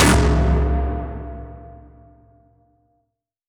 Sound effects > Electronic / Design
Gunshot Digitized WideReverb
Powerful and instantaneous gunshot from a highly technological weapon. Version with wide reverb.
digitized, bang, powerful